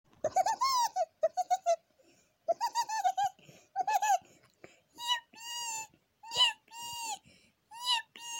Sound effects > Human sounds and actions
Cute Aroflux Drop
The voice of Honor 7A was recorded in a cute, high-pitched, girly manner.